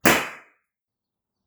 Sound effects > Natural elements and explosions
(1/2) Gunshot of a pocket pistol. This is a different POV to the original indoor gunshot. It's the same gunshot, but this sound was recorded from a second microphone that was placed a couple meters away from the front of the garage door. Recorded using phone microphone.